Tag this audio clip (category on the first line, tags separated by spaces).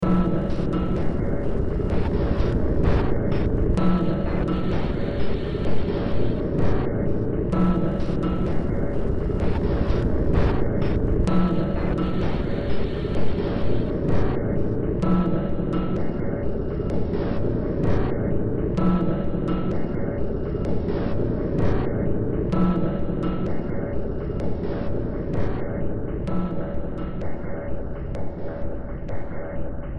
Music > Multiple instruments
Ambient Cyberpunk Industrial Noise Horror Soundtrack Underground Games Sci-fi